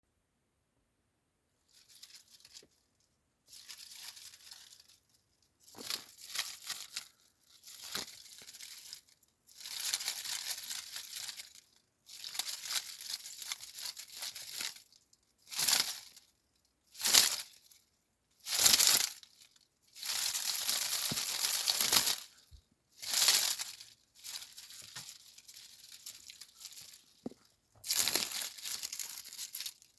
Sound effects > Natural elements and explosions
Dry Leaf Rustling
This is just a dead branch I found outside and took the time to record the movement of.
Blowing, Crackling, Dry, Field, Foley, Leaves, Nature, Rustliung, Trees, Wind